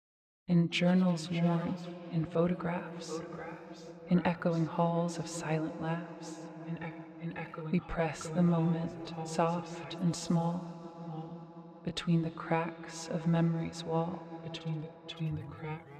Speech > Solo speech
Memories Poem
A short poem about memories